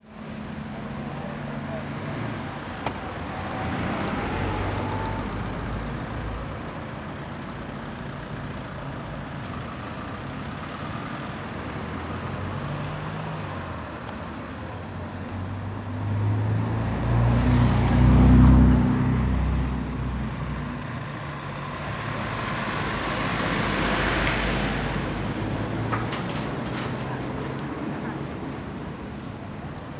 Soundscapes > Urban
Splott - Ambience Busy Traffic Voices Footsteps Birds Bikes - Splott Road
splott, fieldrecording